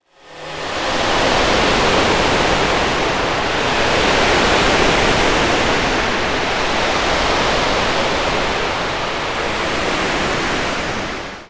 Sound effects > Electronic / Design
Heavy Sea Wave
A continuous Sea Wave Designed with a synth, it somehow feels sci-fi too, designed with Pigments via studio One